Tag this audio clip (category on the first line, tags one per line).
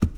Sound effects > Objects / House appliances
bucket
carry
clang
clatter
cleaning
container
debris
drop
fill
foley
garden
handle
hollow
household
kitchen
knock
lid
liquid
metal
object
pail
plastic
pour
scoop
shake
slam
spill
tip
tool
water